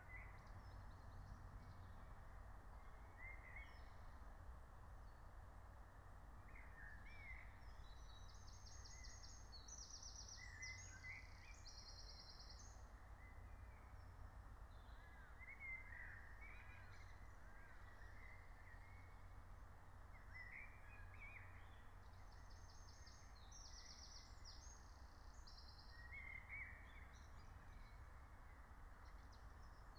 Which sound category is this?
Soundscapes > Nature